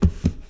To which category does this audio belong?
Sound effects > Objects / House appliances